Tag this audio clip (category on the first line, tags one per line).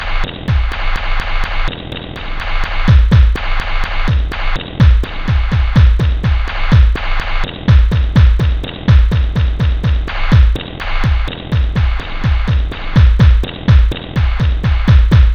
Instrument samples > Percussion
Industrial
Samples
Drum
Alien
Dark
Ambient
Weird
Soundtrack
Underground
Loop
Loopable
Packs